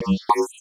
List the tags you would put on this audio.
Sound effects > Electronic / Design
alert options

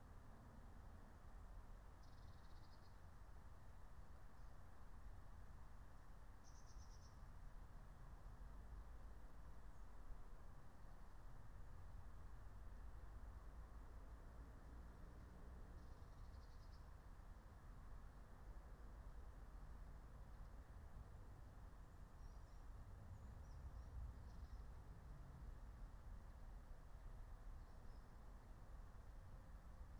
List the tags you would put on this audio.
Soundscapes > Nature
meadow alice-holt-forest soundscape field-recording phenological-recording raspberry-pi nature natural-soundscape

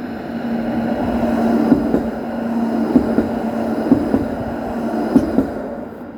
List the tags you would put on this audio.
Sound effects > Vehicles
embedded-track moderate-speed passing-by Tampere

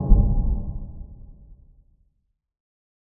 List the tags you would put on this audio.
Sound effects > Electronic / Design
IMPACT LOW HIT BOOMY BASSY RUMBLE IMPACTS DEEP RUMBLING HITS BACKGROUND RATTLING PUNCH

